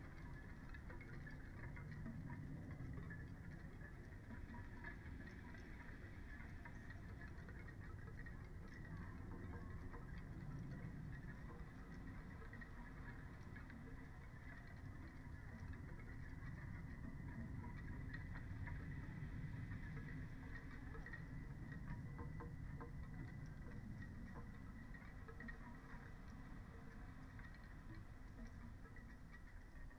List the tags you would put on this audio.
Soundscapes > Nature
sound-installation modified-soundscape alice-holt-forest soundscape raspberry-pi data-to-sound artistic-intervention natural-soundscape phenological-recording weather-data nature field-recording Dendrophone

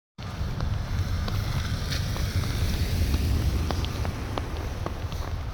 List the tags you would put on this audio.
Soundscapes > Urban
Car passing studded tires

